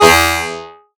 Instrument samples > Synths / Electronic
TAXXONLEAD 1 Ab
additive-synthesis, bass, fm-synthesis